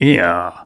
Speech > Other
Ambiguous Interjection
Someone being confused, annoyed, surprised, hurt... it's not clear Male vocal recorded using Shure SM7B → Triton FetHead → UR22C → Audacity → RX → Audacity.